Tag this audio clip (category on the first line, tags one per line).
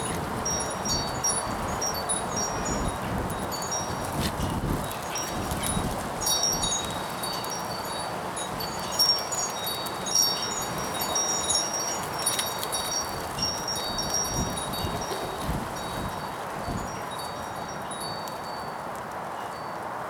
Soundscapes > Other
chimes
clang
jangling
metal
noisy
wind
wind-chimes
windchimes
windy